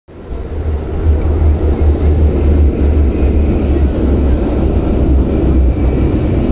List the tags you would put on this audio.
Sound effects > Vehicles
rail
tram
vehicle

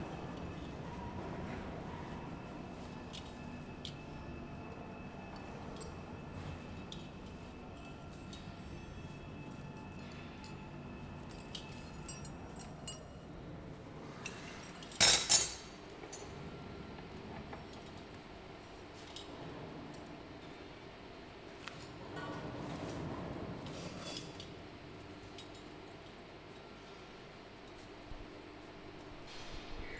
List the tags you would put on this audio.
Soundscapes > Urban
factory
building
metallic
worksite

